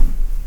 Sound effects > Other mechanisms, engines, machines
shop foley-006
bam, knock, bang, sfx, bop, tools, foley, tink, boom, metal, strike, rustle, pop, sound, percussion, shop, fx, perc, wood, oneshot, thud, crackle, little